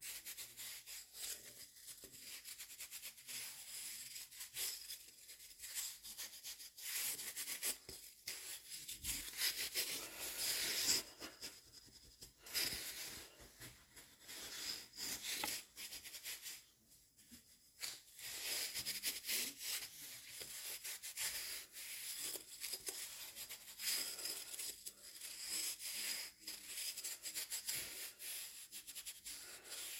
Sound effects > Human sounds and actions
HMNSkin-Samsung Galaxy Smartphone Scrubbing, Skin, Brush Nicholas Judy TDC
Scrubbing skin with a brush.